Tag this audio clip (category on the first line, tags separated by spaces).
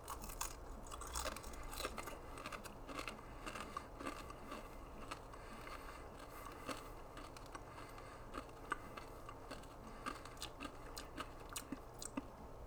Sound effects > Human sounds and actions
foley Blue-brand human eat Blue-Snowball bacon